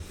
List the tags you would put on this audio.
Sound effects > Objects / House appliances
spill knock kitchen pail water pour debris cleaning fill tip clang carry liquid object foley tool garden metal scoop drop container clatter slam hollow lid bucket plastic household handle shake

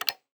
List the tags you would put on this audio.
Sound effects > Human sounds and actions
off toggle click button switch interface activation

